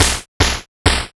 Instrument samples > Percussion
Synthed with phaseplant only. Processed with Khs Bitcrusher, Khs Phase Distortion, Khs Clipper, Khs 3-band EQ, Waveshaper.